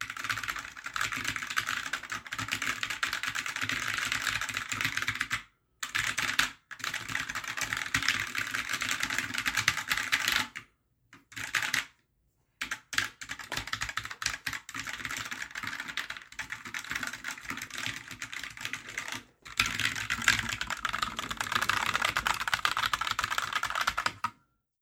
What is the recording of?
Sound effects > Objects / House appliances
A typewriter typing.